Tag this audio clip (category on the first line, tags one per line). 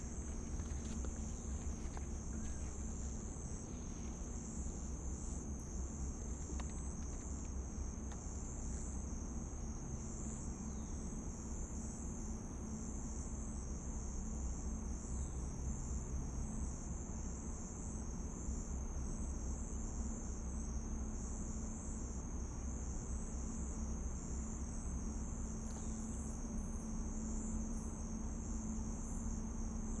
Soundscapes > Urban
field-recording
water
bay
waves
morning
barge
lapping